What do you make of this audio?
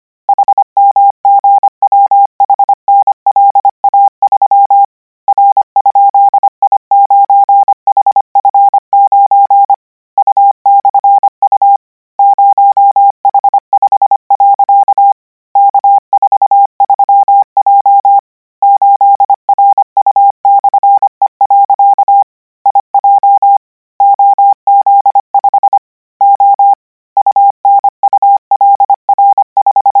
Sound effects > Electronic / Design
Koch 32 KMRSUAPTLOWI.NJEF0YVGS/Q9ZH38B?4 - 840 N 25WPM 800Hz 90%
Practice hear characters 'KMRSUAPTLOWI.NJEF0YVGS/Q9ZH38B?4' use Koch method (after can hear charaters correct 90%, add 1 new character), 840 word random length, 25 word/minute, 800 Hz, 90% volume. Code: hmgwhnla3 r?i9hf9 u/u 0h5. k43j 8ru/e. ij oz5 o unulr5sq z mgp fo4 9irsh4n 5fbrgwpb. ku?z84j 3?iha pttkp v3a ?z u/t /i?ft??wz 5vmzz mze5nwhbl 9nw nmi.0vw bsiy/n.f no r/eb q0.u3 y89?nil8l lhm5p0 v3e/0?va uyh4?.8/ omy ob u?swfn?i g8h rf gwwy pokfwy geu9nt bgkl0ga 3t3p a0n q/3tmn 58mnwlet ffju5zk 5pqhq /e30/84g0 5? ?nn3bbory rjqlayko euzak z0geeznb lhs085v?s tlbtya//? y0kfojj4 i s8sqez fu tt.jbl0h5 .pl8 /ijz/ 8yzgry y/9z ut3. 5mm to?/ ou k 5yg gpap bk3mt/n .emj/w.nw 0s/. est e8ajl.3 v i8vymre 5vppek nlwfe 4.9jguo qagv p53 gfoh/930 s3/yj ?tsvi.3s e w/iai /gh..5 y9z wlboem8h qb4t w 4el4abzy jf3p sf0mzkr53 n9eg z0.
morse, characters, radio, code, codigo